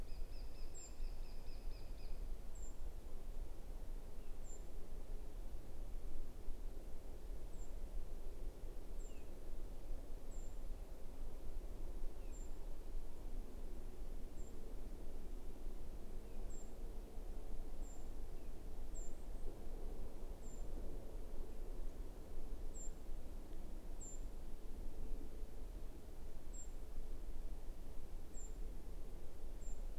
Soundscapes > Urban
VEHCnst Distant construction machine in the forest with winter birds Lösen, Sweden
Recorded 15:14 12/01/26 Out in the forest what I think is an excavator or other construction vehicle is heard moving heavy things around, probably a couple hundred meters away. It’s quite quiet otherwise, though birds such as goldcrests and later a great tit and nuthatch call. Zoom H5 recorder, track length cut otherwise unedited.
Stereo, Birds, Daytime, Construction, Quiet, Karlskrona, Distant, Forest, Pine, Machine, Nuthatch, Vehicle, Goldcrest